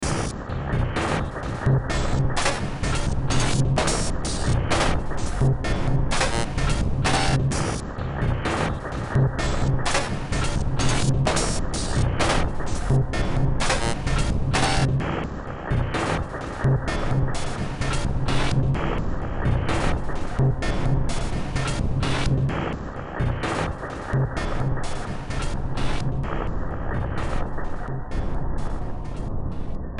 Music > Multiple instruments
Demo Track #3087 (Industraumatic)
Ambient, Cyberpunk, Games, Horror, Industrial, Noise, Sci-fi, Soundtrack, Underground